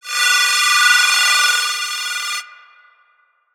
Sound effects > Electronic / Design
phone (cyberpunk ambience)
some synthwave/cyberpunk style sound design this morning
80s, ambience, cyberpunk, electronic, retro, sfx, synth, synthwave, techno